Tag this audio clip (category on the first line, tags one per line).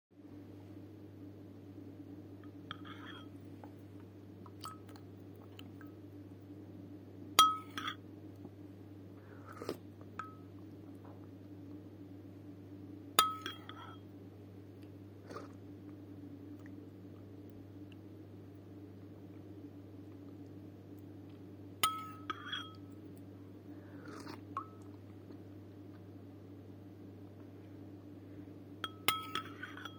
Sound effects > Human sounds and actions
food
soup
eating